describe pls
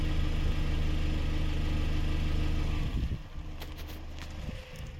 Sound effects > Other mechanisms, engines, machines
Description (Car) "Car Idling: whirring fans, moving pistons, steady but faint exhaust hum. Close-range audio captured from multiple perspectives (front, back, sides) to ensure clarity. Recorded with a OnePlus Nord 3 in a residential driveway in Klaukkala. The car recorded was a Toyota Avensis 2010."